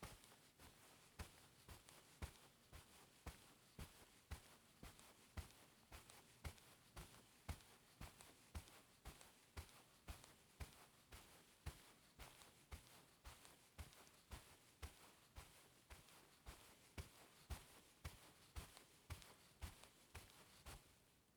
Sound effects > Human sounds and actions
footsteps, carpet, fast walk
walking, footsteps, carpet, foley